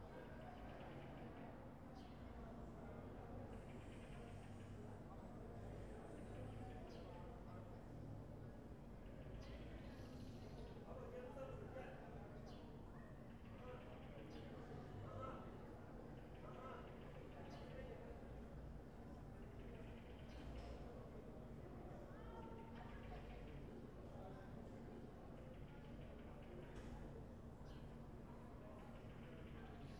Soundscapes > Urban
A lively evening ambience recorded near a restaurant in Tbilisi. Features tourist chatter, Georgian speech, frequent bird activity, and a warm outdoor atmosphere. A vibrant, social urban soundscape with natural elements. If you’d like to support my work, you can get all my ambience recordings in one pack on a pay-what-you-want basis (starting from just $1). Your support helps me continue creating both free and commercial sound libraries! 🔹 What’s included?